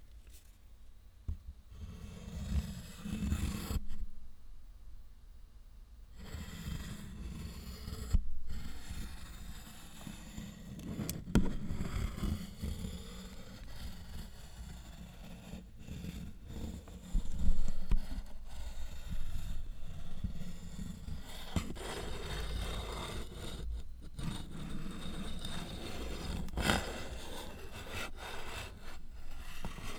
Sound effects > Human sounds and actions
Wood Scrape Floor
Dragging wooden picture frame on the floor, used to mimic sound of wooden cross. Recorded on AT2020 Condenser
drag, floor, scrape, wood